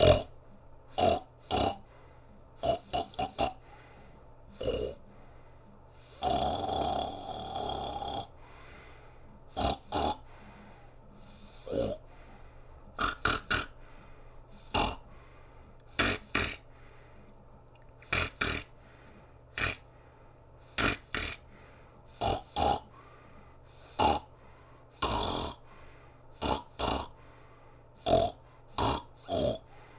Sound effects > Animals
TOONAnml-Blue Snowball Microphone Pig Snort, Human Imitation, Cartoon Nicholas Judy TDC
A pig snorting. Human imitation. Cartoon.
Blue-brand, Blue-Snowball, cartoon, human, imitation, pig, snort